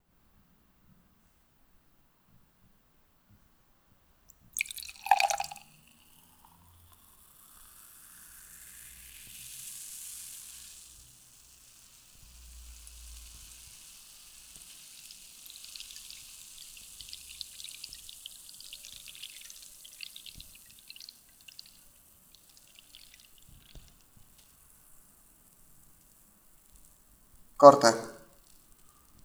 Sound effects > Objects / House appliances
Serving Champagne on Glass 2
Serving a full bottle of champagne in a glass. Can clearly make out the initial "gloob gloob" and afterwards the "fizz" of the bubbles.
Gastronomy, Champagne, Fizz, Serving